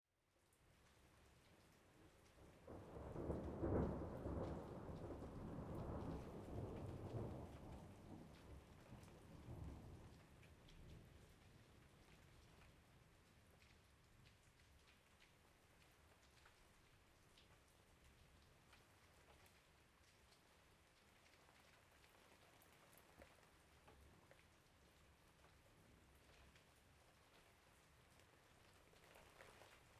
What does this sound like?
Soundscapes > Nature
Progressively louder thunderbolts
Rain and a series of thunderbolts, from a low rumble to a high-intensity thunderbolt toward the end of the recording.
quiet, atmosphere, progressive, ambient, loud, thunderbolts